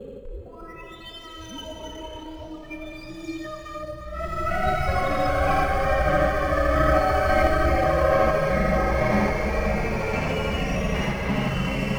Sound effects > Electronic / Design
Murky Drowning 16
noise-ambient, sci-fi, cinematic, horror, mystery, sound-design, scifi, noise, vst, content-creator